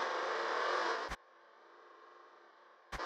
Sound effects > Experimental
snap crack perc fx with verb -glitchid 004
zap, alien, fx, whizz, glitchy, pop, perc, experimental, percussion, idm, impacts